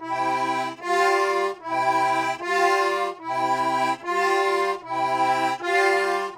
Music > Solo instrument
MUSCInst-Blue Snowball Microphone, CU Accordion, Slow Squeezes, Looped Nicholas Judy TDC
Slow accordion squeezes. Looped.
squeeze, Blue-brand, loop, accordion, Blue-Snowball, slow